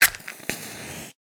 Sound effects > Objects / House appliances
Matchsticks LightMatch 1 Narrative
Lighting a matchstick, recorded with an AKG C414 XLII microphone.
matchsticks, light-fire, burning